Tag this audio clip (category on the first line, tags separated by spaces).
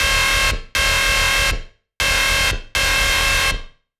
Sound effects > Electronic / Design
alarm alert beep electronic sci-fi scifi UI